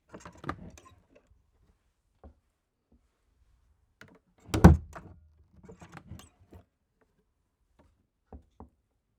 Sound effects > Objects / House appliances
Indoor door (with a stapled blanket for insulation)
Subject : Door sounds opening/closing. One separating the living area and the "basement" area. Covered with blankets for extra insulation. Date YMD : 2025 04 22 Location : Gergueil France Hardware : Tascam FR-AV2 and a Rode NT5 microphone in a XY setup. Weather : Processing : Trimmed and Normalized in Audacity. Maybe with a fade in and out? Should be in the metadata if there is.
Dare2025-06A, Door, FR-AV2, hinge, indoor, NT5, Rode, Tascam, XY